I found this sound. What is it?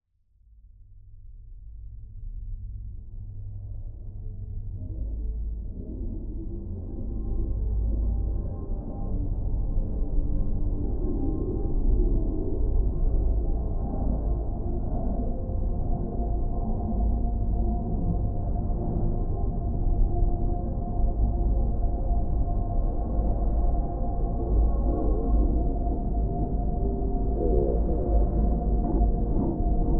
Soundscapes > Synthetic / Artificial
soft ambient industrial track
ambient, industrial, soft